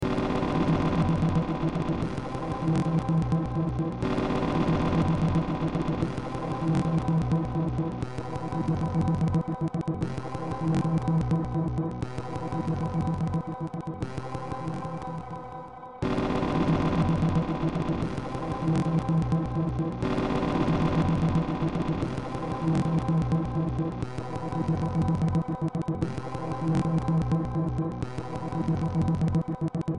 Music > Multiple instruments
Demo Track #3108 (Industraumatic)
Ambient, Cyberpunk, Games, Horror, Industrial, Noise, Sci-fi, Soundtrack, Underground